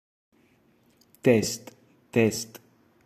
Speech > Solo speech
Just a test sound to try some features.
test, voice